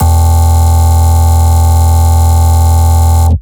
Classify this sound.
Instrument samples > Synths / Electronic